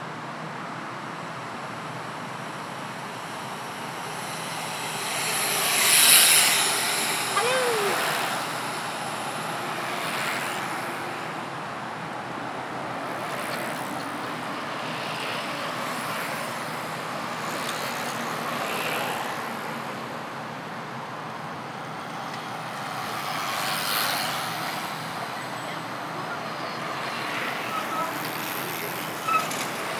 Soundscapes > Urban
Every year there is an event where several thousand people ride their bicycles around the city. This is one of the locations I chose to record, on a downhill near a freeway with some construction and pressure washing so there are various other sounds as well.